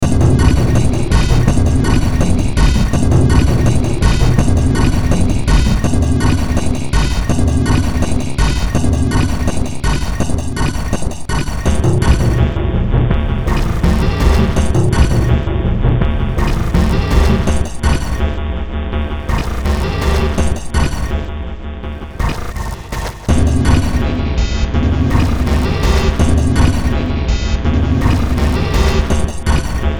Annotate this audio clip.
Music > Multiple instruments
Short Track #3462 (Industraumatic)
Cyberpunk, Horror, Ambient, Noise, Industrial, Underground, Sci-fi, Soundtrack, Games